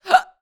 Sound effects > Human sounds and actions
hiccup sound. Recorded by myself on a Zoom Audio Recorder.
hiccup, human, sound